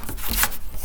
Sound effects > Other mechanisms, engines, machines
metal shop foley -153
percussion, little, strike, bang, foley, crackle, bop, rustle, bam, fx, tools, boom, shop, knock, sfx, pop, perc, metal, thud, oneshot, sound, tink, wood